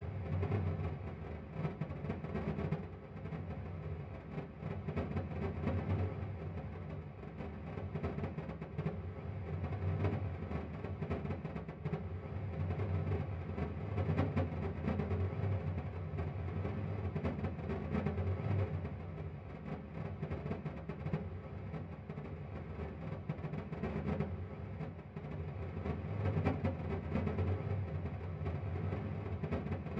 Soundscapes > Synthetic / Artificial
Rain outside
Ambient noise made in DAW to imitate raindrops (and rain) banging on a window while a listener is located in a house. This version contains only rain sounds.
rain, raindrops, rain-outside, weather, wind